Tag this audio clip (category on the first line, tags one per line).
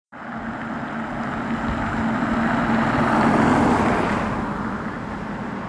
Sound effects > Vehicles
passing-by
studded-tires